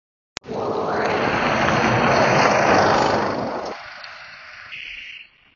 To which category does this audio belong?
Soundscapes > Urban